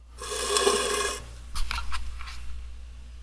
Sound effects > Objects / House appliances
aluminum can foley-004
sfx, foley, metal, tap, water, scrape, fx